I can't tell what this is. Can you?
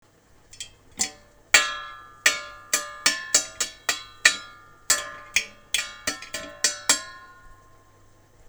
Sound effects > Objects / House appliances
Pan Percussion
Sound created hitting metal sieve pan with spoon
Abstract, Percussion, Kitchen